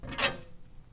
Sound effects > Other mechanisms, engines, machines
Handsaw Oneshot Hit Stab Metal Foley 7
percussion, household, saw, twangy, foley, plank, fx, sfx, smack, twang, metal, shop, handsaw, vibration, hit, tool, perc, vibe, metallic